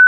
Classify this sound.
Sound effects > Electronic / Design